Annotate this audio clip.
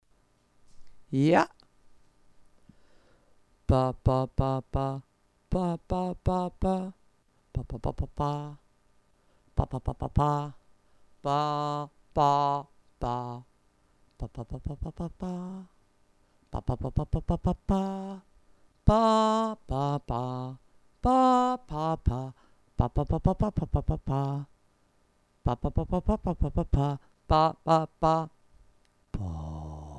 Sound effects > Experimental
for free. Thank you volks!